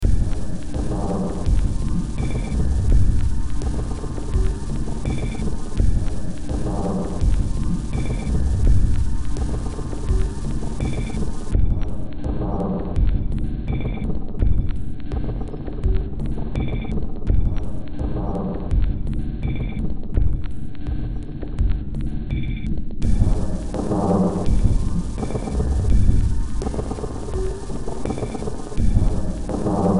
Music > Multiple instruments
Demo Track #3141 (Industraumatic)
Industrial, Ambient, Soundtrack, Horror, Noise, Underground, Cyberpunk, Sci-fi